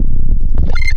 Instrument samples > Synths / Electronic
CVLT BASS 18
bass stabs drops bassdrop sub subs subbass subwoofer low lowend clear wobble lfo wavetable synthbass synth